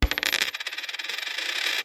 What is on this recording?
Sound effects > Objects / House appliances
OBJCoin-Samsung Galaxy Smartphone Dime, Drop, Spin 09 Nicholas Judy TDC
A dime dropping and spinning.
dime, Phone-recording, drop, spin, foley